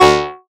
Instrument samples > Synths / Electronic
TAXXONLEAD 2 Gb
additive-synthesis, fm-synthesis, bass